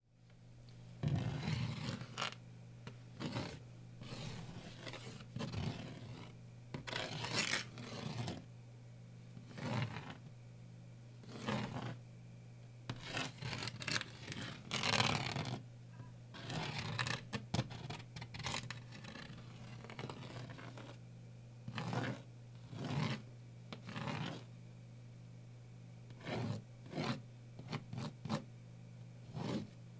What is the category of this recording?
Sound effects > Human sounds and actions